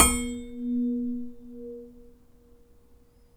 Sound effects > Other mechanisms, engines, machines
metal shop foley -087
tools, thud, little, knock, boom, foley, perc, shop, sound, bam, oneshot, tink, rustle, strike, bang, pop, bop, wood, percussion, sfx, metal, crackle, fx